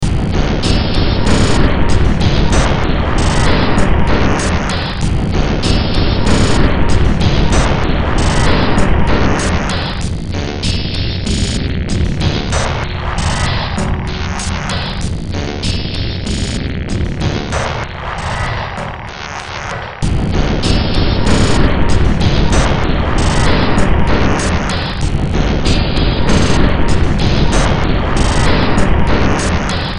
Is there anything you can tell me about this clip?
Music > Multiple instruments
Demo Track #3362 (Industraumatic)
Ambient, Cyberpunk, Games, Horror, Industrial, Noise, Sci-fi, Soundtrack, Underground